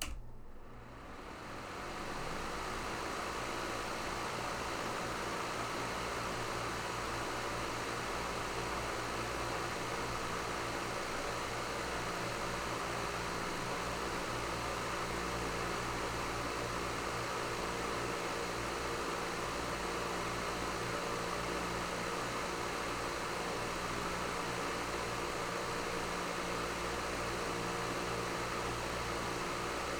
Sound effects > Other mechanisms, engines, machines
MACHFan-Blue Snowball Microphone, MCU Large, Turn On, Run, Off Nicholas Judy TDC
A large fan turning on, running and turning off.